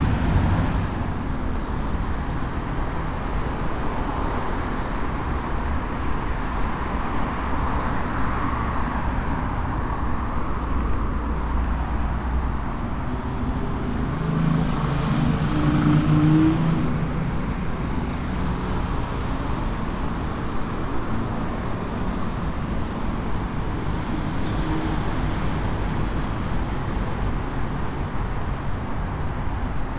Soundscapes > Urban
Heavy city traffic during rush hour. This is not recorded from a steady spot. It is recorded while I am walking through the traffic for about 2 kilometers. At first on a big avenue and then on a kind of a smaller street that leads to the metro station. My footsteps are NOT heard. What is hears is just the traffic with occasional cars, trucks, motorcycles passing by. Also, while walking I am passing through points that a sound is coming out from a radio, or a bit of small talk is heard.